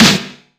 Instrument samples > Percussion
A lower Q value creates a wider band in the EQ frequency editor. Then I boosted widely the mid-lows, and with a wide notch the 55 Hz and the 26 Hz and then with 4 narrow notch cuts I did mildly cut some highs (but not absolute cuts; not reaching the bottom because this causes the remaining frequencies to dance wildly and this distorts the future music you put after the compression).
death-metal, deathsnare, grating, chorusnare, snared-drum, electrosnare, rock, drum, doom-metal, timpano, snare, hoarse, snareflang, mainsnare, metal, main-snare, active-snare, doom, DW, robotic-snare, strike, Gretsch, thrash-metal, Mapex, Yamaha, Ludwig, percussion, doomsnare, Pearl, robosnare